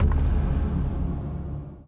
Sound effects > Electronic / Design
A magical staff hitting the ground, I wanted the sound to be powerful & dark, I just implemented low end tension and didn't add any more magical source, the sound can be used as it is and also can be used with different magical sources. The wooden sound itself is actually made with pigments through different noises, with some EQ and some AIR plugins I achieved a sound that could sound like wood if layered properly, the other sounds are also came from the first sound with some GRM plugins.
Hit, Sorcery, Staff, Magical, Impact, Energy, Enchanted